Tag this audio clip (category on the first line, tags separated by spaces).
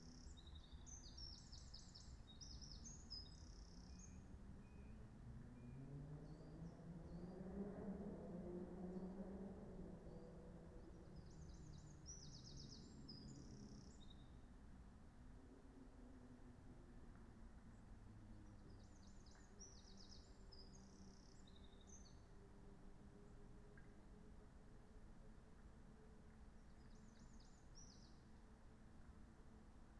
Soundscapes > Nature
raspberry-pi Dendrophone natural-soundscape sound-installation